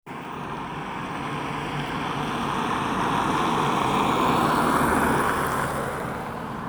Soundscapes > Urban
voice 14-11-2025 2 car
CarInTampere, vehicle, Car